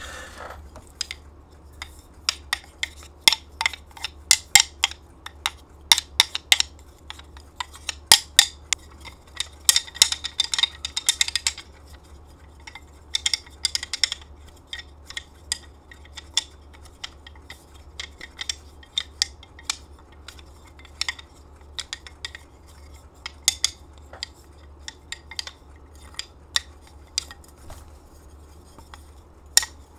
Sound effects > Objects / House appliances
CREAHmn-Blue Snowball Microphone, CU Skeleton, Bones Rattling, Movement Nicholas Judy TDC
Skeleton bones rattling and movement.
Blue-brand, Blue-Snowball, bones, foley, movement, rattle, skeleton